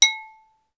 Sound effects > Objects / House appliances
CERMImpt-Blue Snowball Microphone, CU Ceramic, Clink Nicholas Judy TDC
A ceramic clink. Similar to the Disney Blu-Ray languages menu sound.
Blue-brand, Blue-Snowball, ceramic, clink, foley, impact